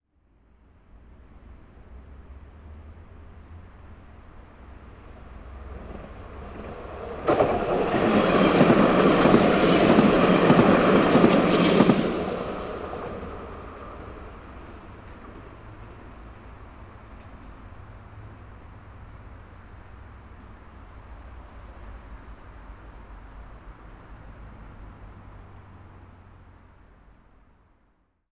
Sound effects > Vehicles
250617 173045 FR Tram passing by
Tram / short train passing by. (Take 3) Tramway passing from left to right, between Brimborion station and Musée de Sèvres station, on the T2 line, travelling through the western suburbs of Paris, France. In the background, traffic from the surrounding city. Recorded in June 2025 with a Zoom H5studio (built-in XY microphones). Fade in/out applied in Audacity.